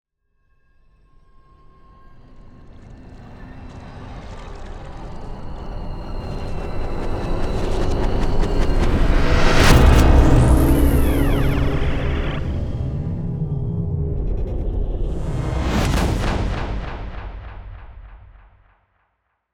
Sound effects > Other
Sound Design Elements SFX PS 047
Effects recorded from the field.
bass, boom, cinematic, deep, effect, epic, explosion, game, hit, impact, implosion, indent, industrial, metal, movement, reveal, riser, stinger, sub, sweep, tension, trailer, transition, video, whoosh